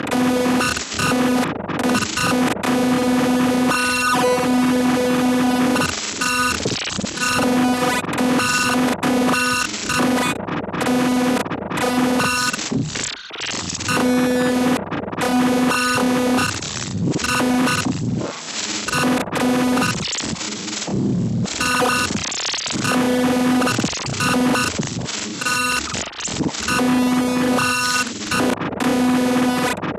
Sound effects > Electronic / Design
RGS-Random Glitch Sound 14
Synthed with phaseplant only. Sample used from 99Sounds.
Ambient, Glitch, Synthesizer, Texture, Weird